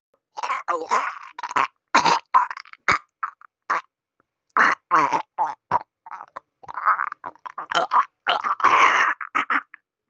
Sound effects > Human sounds and actions
Throat Slit
I recorded this thing with my phone's microphone.
gore, death